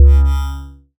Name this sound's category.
Instrument samples > Synths / Electronic